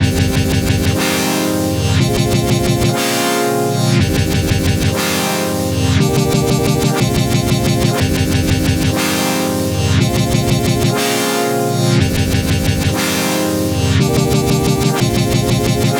Music > Other
DAYDREAM ONE
A pulsating musical intro loop. Built with aesthetic sidechain pumping and dense harmonic layers. Designed as a modular building block for high-energy song starts.
120bpm, aggressive, intro, lofi, loop, musical-piece, pumping, rhythmic, song-starter, synth